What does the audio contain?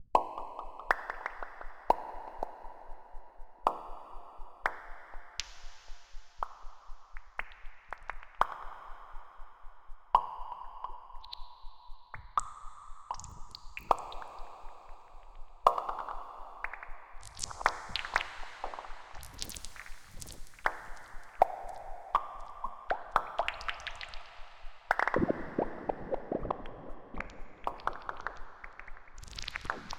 Soundscapes > Synthetic / Artificial
Blip Blop Reverb and Echoes #004
blip, echo, bounce, delay, torso, torso-s4, blop, drop, reverb